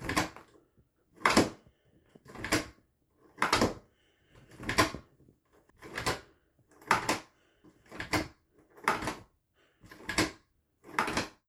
Sound effects > Objects / House appliances
DOORHdwr-Samsung Galaxy Smartphone, CU Door Lock, Locking, Unlocking Nicholas Judy TDC
A door lock locking and unlocking.
door, foley, lock, Phone-recording, unlock